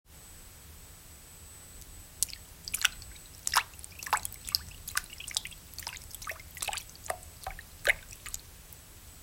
Sound effects > Human sounds and actions
Sound of splashing of water in a full sink basin.
Wet, Water, Splashing, Liquid, Sink, Splash